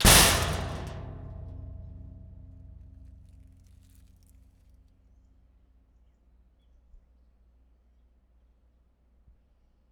Sound effects > Objects / House appliances
Metallic Slam Bang from Closing Gate
The slam of a metal gate closing
hit, metallic, hard, closing, close, slam, gate, door, closed, field-recording, closes